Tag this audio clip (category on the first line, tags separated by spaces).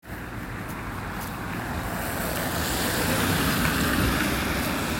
Sound effects > Vehicles
auto car city field-recording street traffic